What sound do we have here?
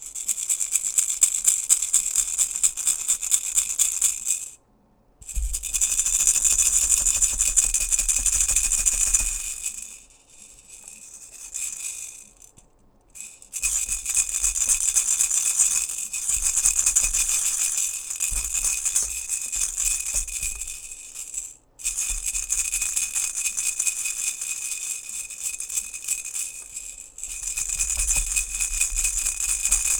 Music > Solo percussion
MUSCShake-Blue Snowball Microphone, CU Baby Rattle Nicholas Judy TDC
A baby rattle rattling.
rattle, Blue-brand, Blue-Snowball, baby, shake